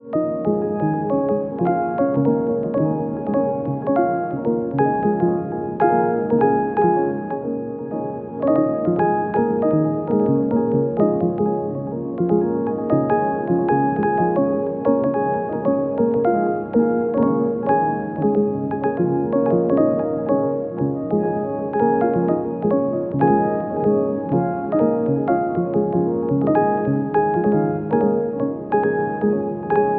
Soundscapes > Synthetic / Artificial
Botanica-Granular Ambient 15
Granular, Beautiful, Ambient, Botanica, Atomosphere